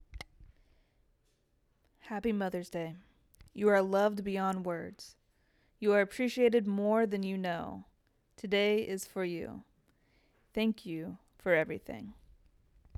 Speech > Solo speech
Mother's Day Whisper – Soft and Gentle ASMR
A soft, whisper-style Mother's Day voice-over, perfect for ASMR videos, calming projects, or gentle heartfelt moments. Script: (soft whisper) "Happy Mother’s Day... You are loved beyond words... You are appreciated more than you know... Today is for you. Thank you for everything."
GentleMessage, MothersDay, WhisperVoice, ASMR, VoiceOver, RelaxingVoice